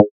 Instrument samples > Synths / Electronic
FATPLUCK 8 Ab
bass, fm-synthesis